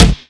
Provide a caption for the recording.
Instrument samples > Percussion
tomtrig very strong 1
a tom attack trigger A blend/mixdown of previous attack triggers (not sustain-timbre triggers).
tick, percussive-attack, tom-tom, death, metal, attack, pop, corpsegrind, attack-trigger, low-cut, tom, timpano, timber, thrash, click, strike-booster, thrash-metal, rock, trigger, grindcorpse, floor, snick, floortom, clack, tom-trigger, tom-attack, tomsnick, percussion, impact